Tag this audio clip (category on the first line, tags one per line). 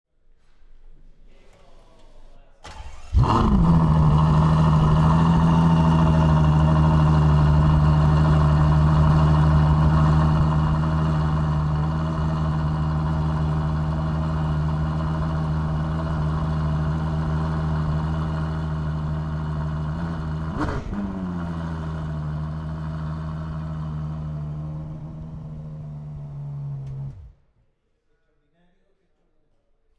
Sound effects > Vehicles
automobile
car
engine
Ferrari
ignition
motor
start
vehicle